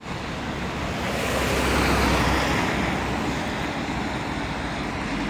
Sound effects > Vehicles
Car-passing-18
car, drive, engine, hervanta, outdoor, road, tampere